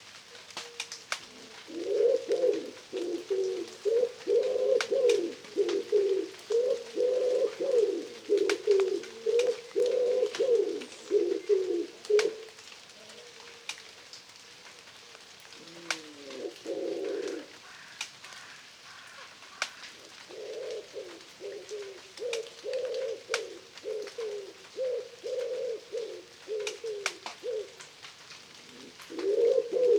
Soundscapes > Nature
Wood pigeon calling during a period of light rainfall. This was recorded during the first significant rain in the area for some time. This was about 06:25 in the morning. In addition to the main wood pigeon calling, another can occasionally be heard in the background, as can a carrion crow. The flight call of a collared dove can be heard at around 00:38. Recorded with a Zoom F3 and Earsight standard microphones. The mics were taped to the underside of two branches of a tree in a suburban garden.